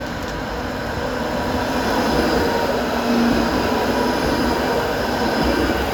Sound effects > Vehicles
tram-samsung-10
Recording of a tram (Skoda ForCity Smart Artic X34) near a roundabout in Hervanta, Tampere, Finland. Recorded with a Samsung Galaxy S21.
outside, tram, tramway, vehicle